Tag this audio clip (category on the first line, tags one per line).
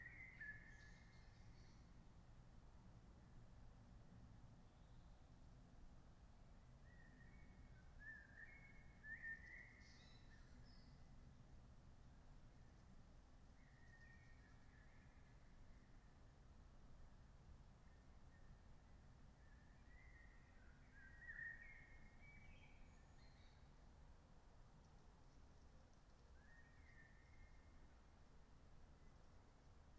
Soundscapes > Nature
alice-holt-forest
weather-data
modified-soundscape
field-recording
raspberry-pi
data-to-sound
soundscape
artistic-intervention
Dendrophone
nature
sound-installation
natural-soundscape
phenological-recording